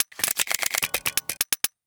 Music > Multiple instruments
128bpm glock pistol handling music loop
128, 128-bpm, 128bpm, beat, bpm, click, clicking, firearm, glock, glock-17, glock17, gun, handling, loop, metal, minimal, music, pistol, simple, strange, touch, weird